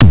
Sound effects > Electronic / Design
bassdrum i used in my song "cubical stadium"
made in openmpt by mee!
bd, progression